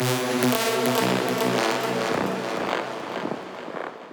Instrument samples > Synths / Electronic
CVLT BASS 27
subwoofer, subs